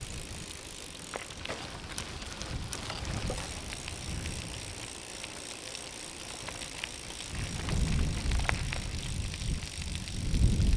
Sound effects > Other mechanisms, engines, machines
SFX Outdoor BicycleRattle
mechanical, soundscape, field-recording, gear, outdoor, rattle, bicycle, foley, movement, background, texture